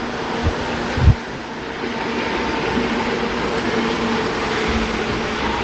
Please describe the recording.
Sound effects > Vehicles
tram passing near
Tram passing by a busy nearby road. Recorded on a walkway next to the busy road, using the default device microphone of a Samsung Galaxy S20+. TRAM: ForCity Smart Artic X34
tram, transport, urban